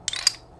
Music > Solo percussion
A teakwood thai xylophone gliss up.